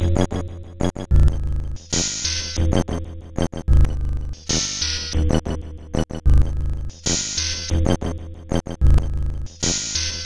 Instrument samples > Percussion
This 187bpm Drum Loop is good for composing Industrial/Electronic/Ambient songs or using as soundtrack to a sci-fi/suspense/horror indie game or short film.
Samples, Underground, Soundtrack, Weird, Dark, Industrial, Loop, Packs, Loopable, Drum